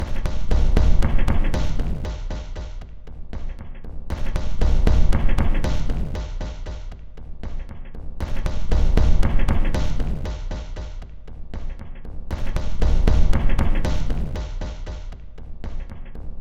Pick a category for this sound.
Instrument samples > Percussion